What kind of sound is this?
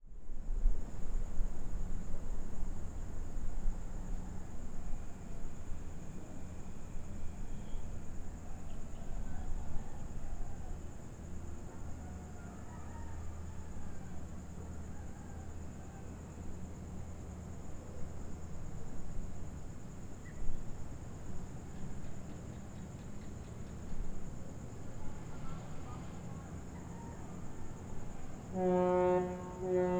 Soundscapes > Other
250801 041552 PH Early morning in a calm Filipino suburb
Early morning atmosphere in a calm Filipino suburban area, with fog horn. I made this recording at about 4:15AM, from the terrace of a house located at Santa Monica Heights, which is a costal residential area near Calapan city (oriental Mindoro, Philippines). One can hear the early morning atmosphere of this place, with some crickets and other insects chirping, as well as very distant roosters, fishermen’s motorboats, machineries, dog barking, and more. At #0:28, one can hear the fog horn of a ship leaving the harbour of the city. Recorded in August 2025 with a Zoom H5studio (built-in XY microphones). Fade in/out applied in Audacity.
fog-horn, field-recording, dog, rooster, chirping, suburban, Philippines, chirp, insects, chirrup, dogs, motorboat, Calapan-city, motorboats, roosters, barking, ambience, atmosphere